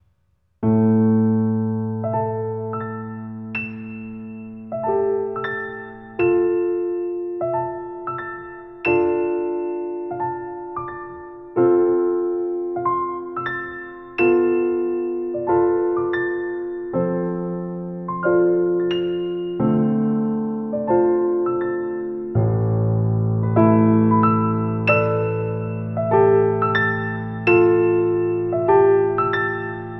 Music > Solo instrument
Piano anxiety ambient
A piano ambient - improvisation. Recorded on Kawai CA-67.
ambient, hollywood, soundtrack, sad, tragedy, piano, cinematic, anxiety